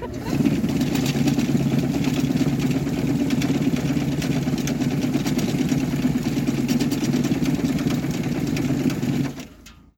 Sound effects > Other mechanisms, engines, machines
MACHMisc-Samsung Galaxy Smartphone, CU Tree Shaker Nicholas Judy TDC
A tree shaker. Recorded at Hanover Pines Christmas Tree Farm.
machine, foley, tree-shaker, Phone-recording